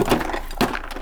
Sound effects > Other mechanisms, engines, machines
shop foley-010
bam, bang, boom, bop, crackle, foley, fx, knock, little, metal, oneshot, perc, percussion, pop, rustle, sfx, shop, sound, strike, thud, tink, tools, wood